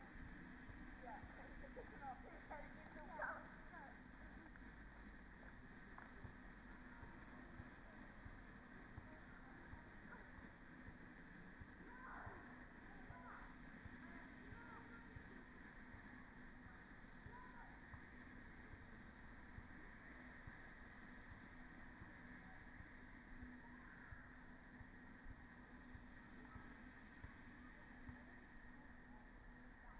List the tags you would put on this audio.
Soundscapes > Nature
alice-holt-forest artistic-intervention data-to-sound field-recording modified-soundscape natural-soundscape phenological-recording soundscape weather-data

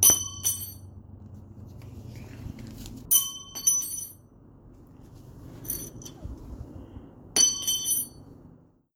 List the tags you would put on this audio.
Sound effects > Objects / House appliances
concrete drop foley Phone-recording wrench

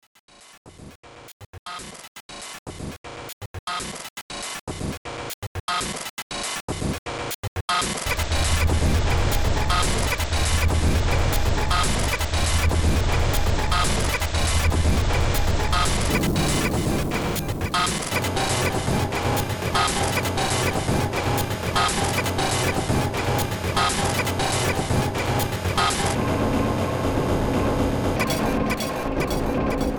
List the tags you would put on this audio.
Music > Multiple instruments
Games Ambient Horror Soundtrack Cyberpunk Underground Sci-fi Industrial Noise

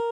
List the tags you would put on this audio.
Instrument samples > String
stratocaster sound guitar tone design arpeggio cheap

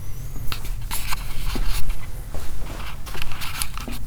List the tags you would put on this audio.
Sound effects > Other mechanisms, engines, machines
fx metal tink shop tools bam bang foley pop crackle oneshot wood knock perc thud sfx rustle sound strike boom bop little percussion

